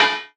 Instrument samples > Synths / Electronic
An abstract metal-y one-shot made in Surge XT, using FM synthesis.
electronic, fm, surge, synthetic